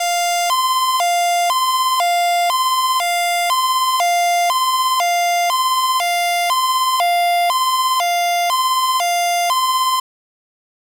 Sound effects > Electronic / Design
A designed alarm SFX created in Phaseplant VST.
alarm, alert, danger, error, repeating, sci-fi, warning